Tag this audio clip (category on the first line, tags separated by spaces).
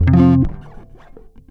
Instrument samples > String
rock blues loop funk mellow slide plucked loops electric riffs